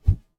Sound effects > Other
phys attack
3 - Attacking with a physical weapon Foleyed with a H6 Zoom Recorder, edited in ProTools
attack, sfx